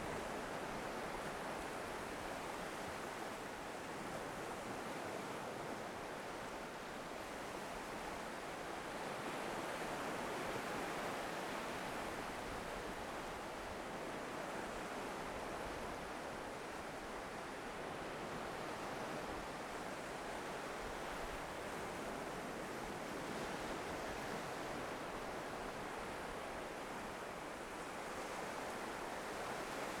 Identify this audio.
Soundscapes > Nature
Denmark - Ishøj Strand - Waves
My very first field recording. Taken in December 2025 at Ishøj Strand in Denmark.
denmark, sea, tascam